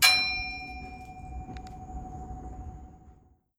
Sound effects > Objects / House appliances
METLImpt-Samsung Galaxy Smartphone, CU Sign, Hit Nicholas Judy TDC
A metal sign being hit.
Phone-recording, hit, sign, metal